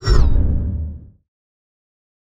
Sound effects > Other
Sound Design Elements Whoosh SFX 050
fx, effects, production, swoosh, dynamic, motion, design, ambient, sweeping, movement, element, transition, trailer, audio, film, cinematic, fast, whoosh, effect, sound, elements